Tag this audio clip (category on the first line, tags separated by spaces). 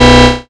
Instrument samples > Synths / Electronic
bass fm-synthesis